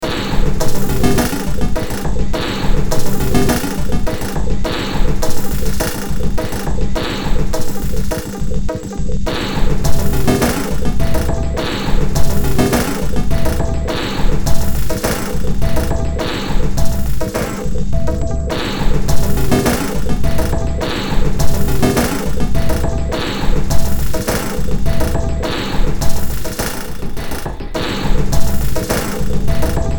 Music > Multiple instruments
Short Track #3437 (Industraumatic)
Underground, Industrial, Sci-fi, Horror, Ambient, Games, Noise, Soundtrack, Cyberpunk